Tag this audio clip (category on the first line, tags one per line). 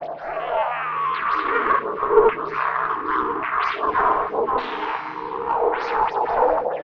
Soundscapes > Synthetic / Artificial
Birdsong
LFO
massive